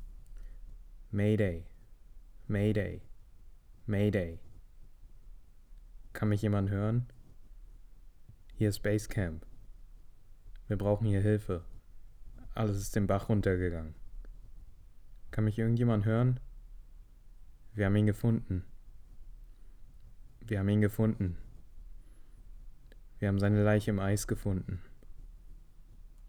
Speech > Solo speech
Voice recording of a distress call in German for use in a Video Game.